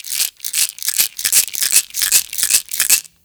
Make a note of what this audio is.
Sound effects > Objects / House appliances
Pill Bottle Shake 1
Pill bottle sounds